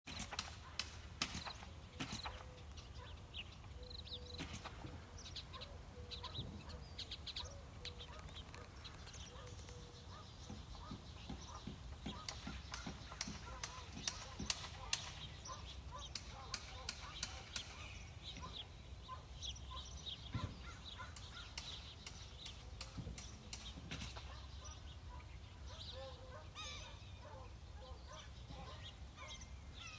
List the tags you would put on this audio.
Soundscapes > Nature
rural present field-recordings ambience

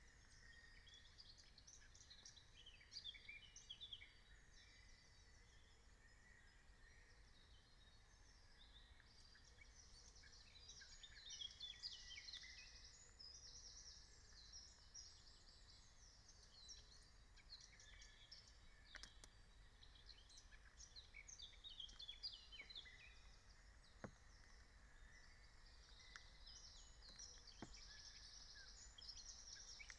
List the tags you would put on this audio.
Soundscapes > Nature
nature field-recording raspberry-pi natural-soundscape soundscape phenological-recording meadow alice-holt-forest

